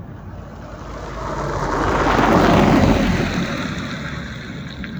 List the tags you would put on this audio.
Sound effects > Vehicles
automobile
car
vehicle

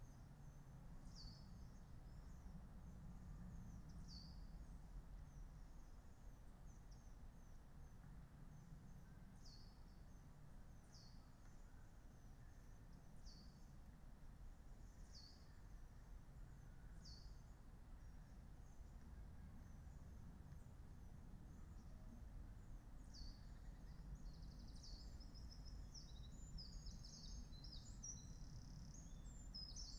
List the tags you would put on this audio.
Soundscapes > Nature
alice-holt-forest
data-to-sound
field-recording
modified-soundscape
natural-soundscape
nature
phenological-recording
soundscape
weather-data

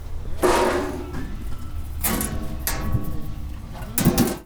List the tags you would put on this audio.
Sound effects > Objects / House appliances
waste
Machine
Junkyard
Clang
Foley
dumpster
Percussion
Environment
SFX
trash
Bang
Perc
Ambience
Smash
Clank
Robotic
Metallic
scrape
FX
Dump
rattle
Metal
dumping
Robot
garbage
Junk
Atmosphere
Bash
rubbish
tube

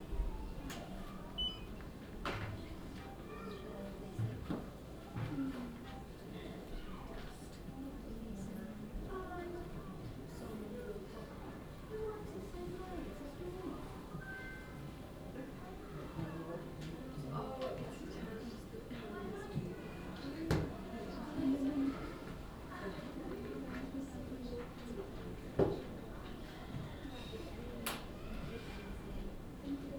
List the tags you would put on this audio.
Soundscapes > Indoors
field-recording
library
interior
ambience
lichfield

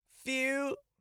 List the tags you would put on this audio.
Speech > Solo speech
SM57
pheeww
Tascam
VA
Mono
phew
Surfer
August
Single-mic-mono
English-language
RAW
Male
mid-20s
A2WS
In-vehicle
20s
oneshot
Cardioid
2025
Dude
Voice-acting
Adult
FR-AV2
France
one-shot

